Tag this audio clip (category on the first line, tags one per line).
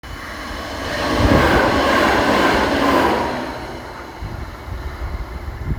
Soundscapes > Urban
field-recording
railway
Tram